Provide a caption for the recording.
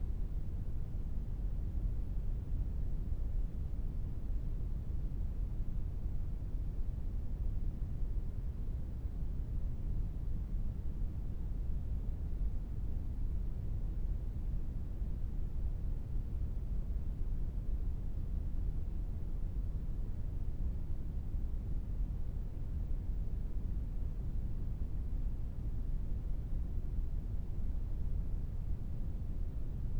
Soundscapes > Synthetic / Artificial
Generated brown noise in ocenaudio and adjusted the pitch and eq until it gave that deep sound you would hear during scenes in an airplane, in this case the character was riding a commercial shuttle to space. Used in my visual novel: R(e)Born_ Recorded with Sony ICD-UX570, referenced with AKG K240.